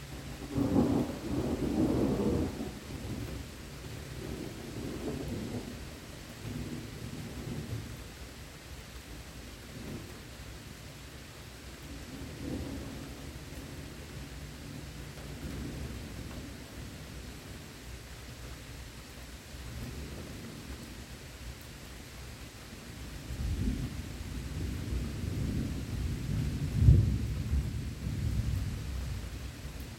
Soundscapes > Nature
Thunder rumbles twice with distant heavy rain.